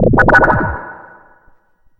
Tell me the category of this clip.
Instrument samples > Synths / Electronic